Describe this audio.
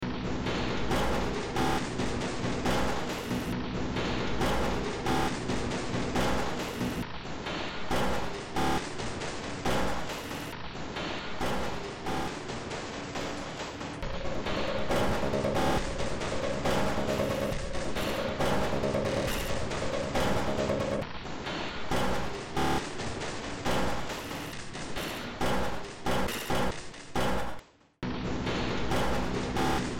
Music > Multiple instruments
Short Track #3650 (Industraumatic)
Ambient, Games, Industrial, Noise, Sci-fi, Soundtrack, Underground